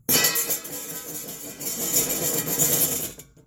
Sound effects > Objects / House appliances
METLImpt-Samsung Galaxy Smartphone, CU Pot, Drop, Spin Nicholas Judy TDC
Metal pot drops and spins.
drop, metal, Phone-recording, pot, spin